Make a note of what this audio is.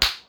Sound effects > Human sounds and actions
Face slap
recorded on phone, removed background noise in audacity.
attack, fight